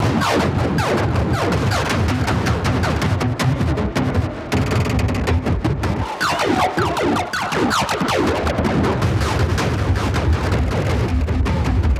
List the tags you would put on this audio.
Music > Multiple instruments
loop bass

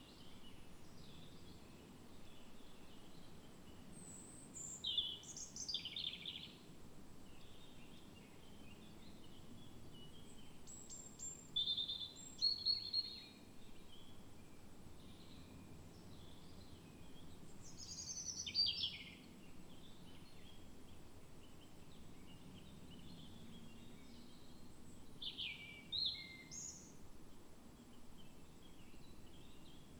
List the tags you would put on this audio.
Soundscapes > Nature
alice-holt-forest weather-data data-to-sound natural-soundscape nature modified-soundscape raspberry-pi Dendrophone sound-installation artistic-intervention soundscape phenological-recording field-recording

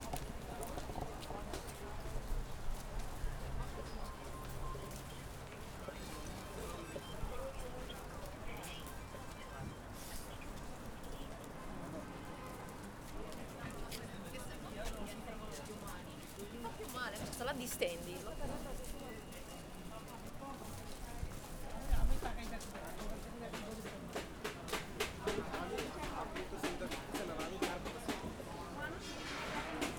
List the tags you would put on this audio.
Soundscapes > Urban
london city bustling market neighbourhood

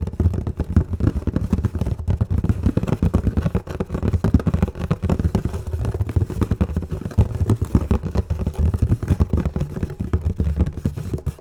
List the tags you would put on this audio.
Sound effects > Objects / House appliances
tool bucket lid handle garden carry water scoop slam clang shake fill kitchen debris container plastic spill pour pail metal tip drop object liquid cleaning foley hollow household knock clatter

